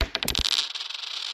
Sound effects > Objects / House appliances
OBJCoin-Samsung Galaxy Smartphone, CU Penny, Drop, Spin 08 Nicholas Judy TDC
A penny dropping and spinning.
drop, spin, foley, penny, Phone-recording